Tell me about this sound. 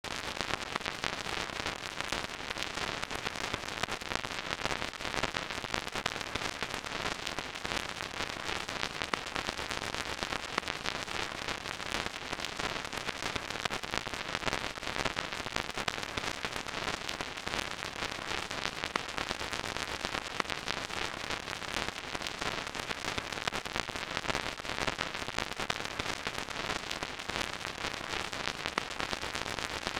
Sound effects > Electronic / Design
Vinyl noise
Despite its origin — a squeaky plastic cat toy (recorded on Tascam DR-05) — this granular synthesis creates a convincing analog vinyl texture: warm crackle, subtle surface noise, and nostalgic hiss. Great for retro UI, dream sequences, or lo-fi atmosphere — no turntable required. If you enjoy these sounds, you can support my work by grabbing the full “Granular Alchemy” pack on a pay-what-you-want basis (starting from just $1)! Your support helps me keep creating both free resources and premium sound libraries for game devs, animators, and fellow audio artists. 🔹 What’s included?
crackle, granular, hiss, lofi, noise, static, surface, tape, warm